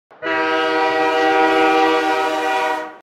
Sound effects > Vehicles
Locomotive Train Horn
The sound of a freight train's horn.
commuter, freight, rail, train